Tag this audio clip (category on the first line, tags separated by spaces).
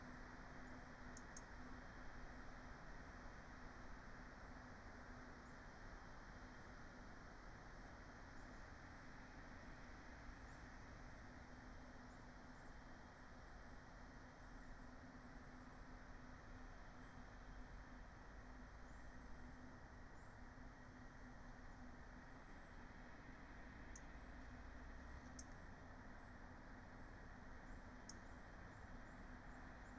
Soundscapes > Nature
alice-holt-forest data-to-sound Dendrophone natural-soundscape raspberry-pi soundscape